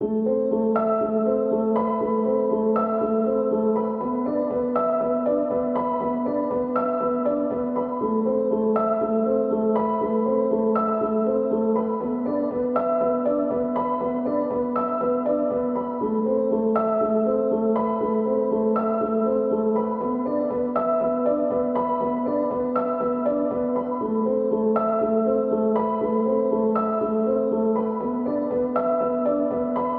Music > Solo instrument
Piano loops 135 efect 4 octave long loop 120 bpm
Beautiful piano music . VST/instruments used . This sound can be combined with other sounds in the pack. Otherwise, it is well usable up to 4/4 120 bpm.
loop, 120bpm, free, simple, 120, simplesamples, reverb, music, samples, piano, pianomusic